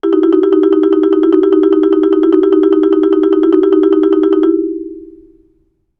Sound effects > Other
Marimba Trill
Recorded and sampled from using LMMS with the Marimba Soft patch from the ILIO Synclavier Percussion + Vol. 2 World and Orchestral CD library.